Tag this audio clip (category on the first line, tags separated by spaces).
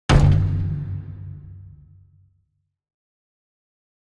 Sound effects > Other
smash collision rumble bang crash sharp blunt hard audio effects cinematic percussive hit power explosion heavy force transient sfx strike game thud impact shockwave sound design